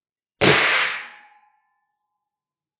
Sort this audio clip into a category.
Sound effects > Natural elements and explosions